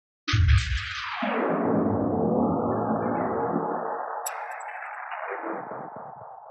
Sound effects > Experimental
destroyed glitchy impact fx -013
glitch, perc, lazer, crack, otherworldy, laser, snap, impacts, sfx, experimental, alien, abstract, impact, whizz, edm, percussion, idm, fx, pop, glitchy, hiphop, zap, clap